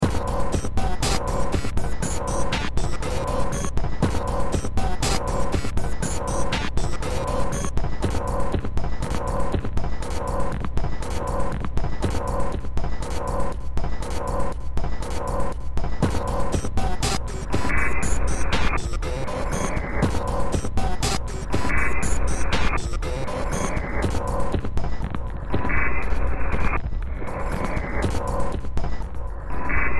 Music > Multiple instruments
Demo Track #3089 (Industraumatic)
Games, Sci-fi, Soundtrack, Underground, Horror